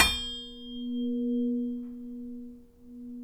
Sound effects > Other mechanisms, engines, machines
metal shop foley -086
foley, strike, tink